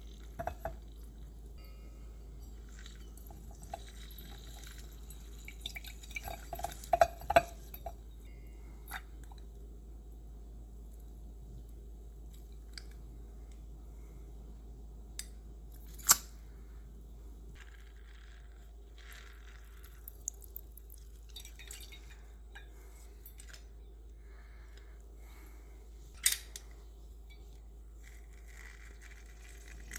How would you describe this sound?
Sound effects > Objects / House appliances
FOODMisc-Samsung Galaxy Smartphone, CU Juicer, Squeeze Lemon, Lime, Slight Movements, Drips Nicholas Judy TDC
A juicer squeezing lemon and lime with slight movement and drips.
drip, foley, juicer, lemon, lime, movement, Phone-recording, squeeze